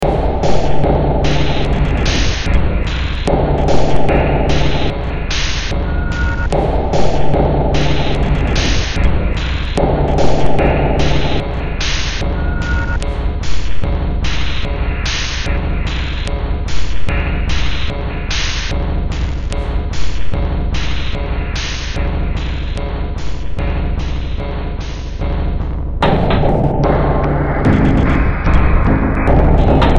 Music > Multiple instruments
Demo Track #3932 (Industraumatic)
Cyberpunk, Games, Noise, Industrial, Ambient, Underground, Soundtrack, Sci-fi, Horror